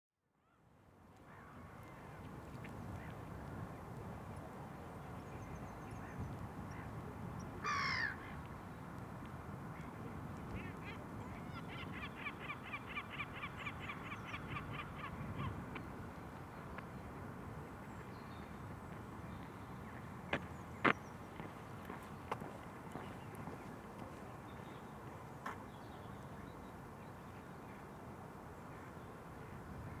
Soundscapes > Urban
A recording at a park in Lichfield city centre using Roland CS-10EMs and a zoom H6 Studio.
ambience, field-recording, lichfield, park